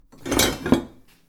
Sound effects > Other mechanisms, engines, machines
Woodshop Foley-102
bam, bop, foley, fx, knock, little, metal, oneshot, perc, percussion, sound, strike, thud, tools